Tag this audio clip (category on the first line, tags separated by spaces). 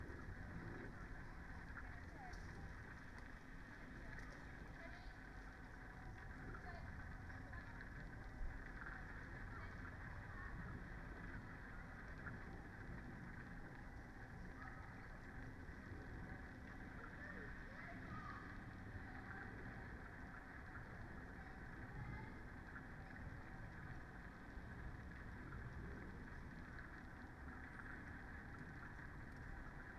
Soundscapes > Nature
sound-installation data-to-sound raspberry-pi soundscape alice-holt-forest nature field-recording natural-soundscape